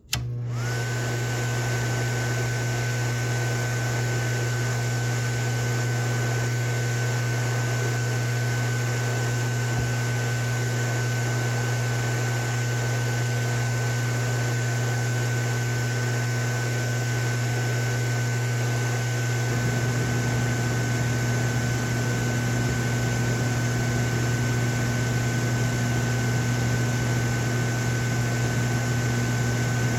Sound effects > Other mechanisms, engines, machines
COMAv-Samsung Galaxy Smartphone, CU Projector, Slide, Fan Nicholas Judy TDC
A slide projector fan.